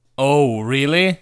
Speech > Solo speech
oh really?
calm, human, male, suprised